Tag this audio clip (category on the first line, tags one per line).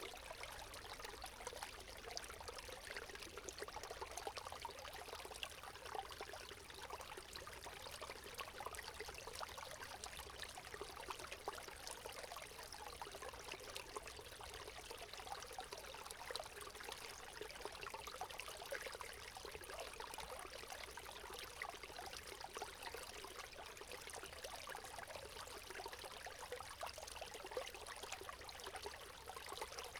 Soundscapes > Nature
field-recording
foley
gentle
outdoor
soundscape
stream